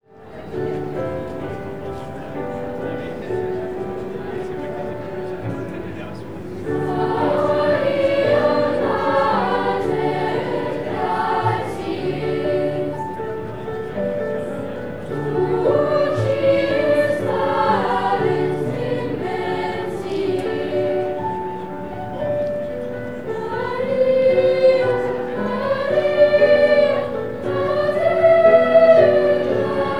Soundscapes > Other
children's choir 3
A children's choir from a Catholic school in Lyon singing in front of their parents. Recorded with a ZOOM H4N pro